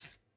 Sound effects > Other
Potato being quickly sliced using a Santoku knife in a small kitchen.